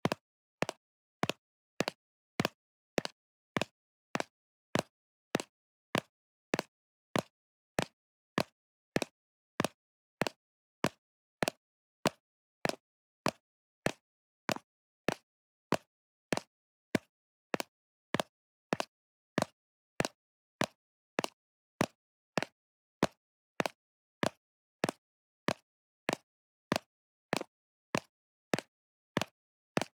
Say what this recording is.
Sound effects > Objects / House appliances
Tic-tac High Heels
High-heel footsteps recreated using two empty Tic Tac containers. The stepping pattern was carefully designed to mimic the natural rhythm of heels on a hard surface. The plastic adds a soft, rounded character to the sound, making it less sharp than real stilettos while still highly believable. If you'd like to support my work, you can get the full “High Heel Foley” pack on a pay-what-you-want basis (starting from just $1). Your support helps me continue creating both free and commercial sound libraries! 🔹 What’s included?
click, concrete, soft, plastic, tictac, foley, walking, rhythm, shoes, realistic, heels, recorded, highheel, dry, footsteps